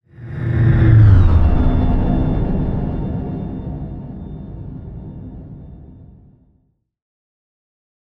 Sound effects > Other
Sound Design Elements Whoosh SFX 037
ambient, audio, cinematic, design, dynamic, effect, effects, element, elements, fast, film, fx, motion, movement, production, sound, sweeping, swoosh, trailer, transition, whoosh